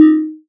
Instrument samples > Synths / Electronic
CAN 2 Eb
bass, fm-synthesis, additive-synthesis